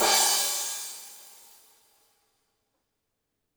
Music > Solo percussion
Recording ook a crash cymbal with all variations